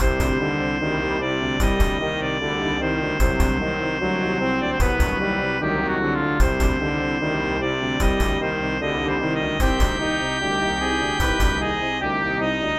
Music > Multiple instruments
Determined loop
Another short loop made in beepbox. Use this for a villan monologue or something where the character is really determined and there is a dark mood.